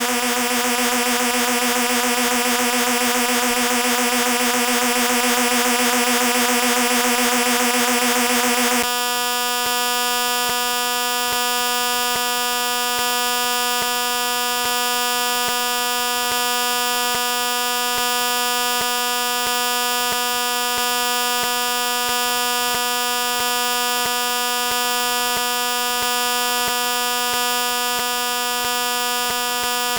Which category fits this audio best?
Sound effects > Objects / House appliances